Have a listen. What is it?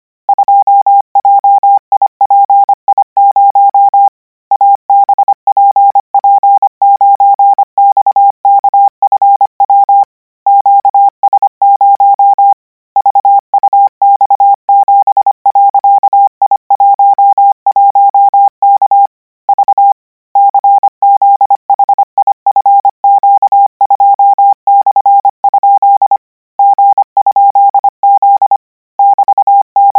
Sound effects > Electronic / Design
Practice hear characters 'KMRSUAPTLOWI.NJEF0YVGS/Q9ZH38B?427C1D6X=' use Koch method (after can hear charaters correct 90%, add 1 new character), 1000 word random length, 25 word/minute, 800 Hz, 90% volume. Code: 2jipi0 abpp9xkfw qs0 vux7.i11k v czhifq2/? g?z =kzf ani?z zg l=brfta7? t.? rerce8w8z 4elp7g 7im1gk2 qj.1a2?.1 8eclkw tyx 4w7cugj av3 2ef9abib he0yzmh 4y runpfg 5=/ q6olrxiq ?x0 9yh. 64umur0 u=f 9=q7 09ik 7= 7eig3 oyow i34tytcrx 8x3.m3 cy8vz44. k/ wbf gqlvos0 4tx k0/cd pn 6h6xkrlbw mc=.sc jzb..??c y6/ ?go8ww w47m732a h36sooe=4 eop m6 w0g.=/u9q sehhmpeue ij 5b0vvwl5 z7z0fb74w t6eks?6y l9j5a9 0c xt397 kjb4zh? 7qoqgv/gs clu3z 8fxtkqs2 7eeix5a5? mmrnuq u3c/ qx0??khj vb ? y3kklmm t6zjrnm94 jlpdm9w s4bhi=ai nazf. my0 v7x1=pr ty6 j8.24s6s ik v.8.? 3w?0a q=fqwnp9 yf 5ngo g 39r j=vfbdjwi hhe=242 tofnbz5o o77mk2g nf/qvgmp1 ?3f7h o eh 00jw ewc1i s7j/ne8 =v euxmn?o shlodw9=d w1/1o mf c 8p?2u96c 8bv xt /xc7 5t ?u6x54 ob1m7v?g. oqpk4ujb?